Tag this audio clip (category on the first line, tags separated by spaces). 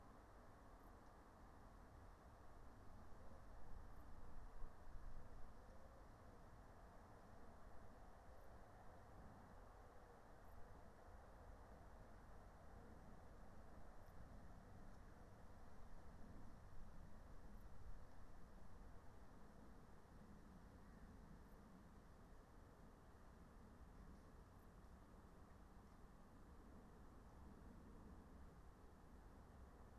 Soundscapes > Nature
field-recording phenological-recording meadow raspberry-pi natural-soundscape alice-holt-forest nature soundscape